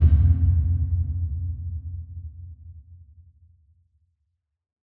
Sound effects > Electronic / Design
RATTLING BOOMY CAVE PUNCH
BASSY, BOLHA, BOOM, BRASIL, BRASILEIRO, BRAZIL, BRAZILIAN, DEEP, EXPLOSION, FUNK, HIT, IMPACT, LOW, MANDELAO, PROIBIDAO, RATTLING, RUMBLING